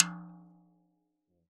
Music > Solo percussion
Hi Tom- Oneshots - 4- 10 inch by 8 inch Sonor Force 3007 Maple Rack
acoustic, beat, beatloop, beats, drum, drumkit, drums, fill, flam, hi-tom, hitom, instrument, kit, oneshot, perc, percs, percussion, rim, rimshot, roll, studio, tom, tomdrum, toms, velocity